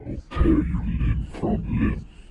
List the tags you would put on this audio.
Speech > Solo speech
deep voice doom lines games demon unrealengine usable speech scary

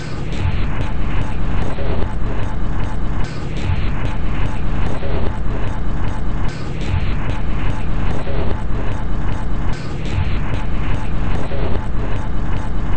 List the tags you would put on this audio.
Instrument samples > Percussion
Weird Industrial Underground Soundtrack Ambient Loop Samples Loopable Dark Drum Alien Packs

